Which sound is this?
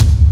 Instrument samples > Percussion
floortom 1 bang start 1b
hit, 16x16, bass, Yamaha, tom-tom, djembe, Sakae, Ludwig, deepbass, drums, Sonor, Pearl, Gretsch, floortom, ashiko, strike, floor, bongo, Mapex, basstom, deeptom, bougarabou, DW, Premier, bata, PDP, drum, tom, dundun, Tama